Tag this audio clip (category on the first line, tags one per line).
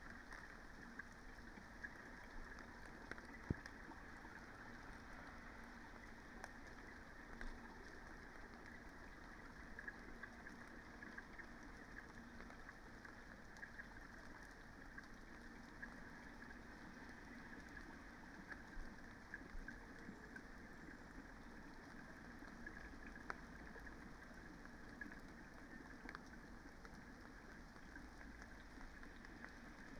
Soundscapes > Nature
raspberry-pi
weather-data
nature
natural-soundscape
alice-holt-forest
data-to-sound
modified-soundscape
Dendrophone
field-recording
phenological-recording
soundscape
sound-installation
artistic-intervention